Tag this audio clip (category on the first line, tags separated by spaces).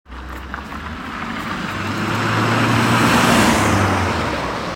Sound effects > Vehicles
vehicle automobile